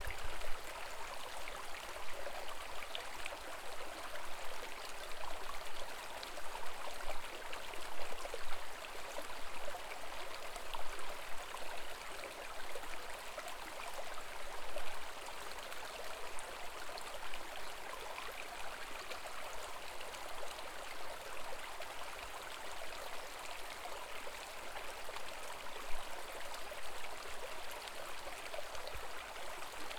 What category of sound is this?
Soundscapes > Nature